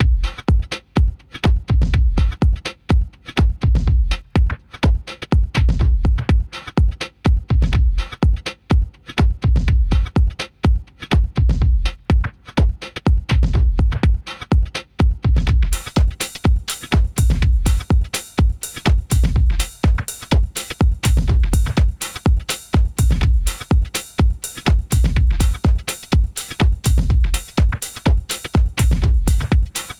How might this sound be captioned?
Instrument samples > Percussion
Drum loop complex 124
4 bar drum loop with variations, 124 bpm